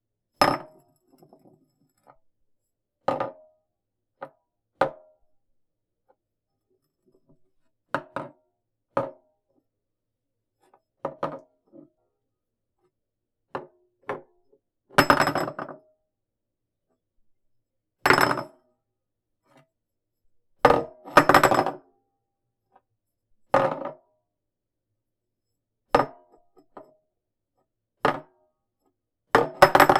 Sound effects > Objects / House appliances
Picking up and dropping a small glass jar on a countertop multiple times.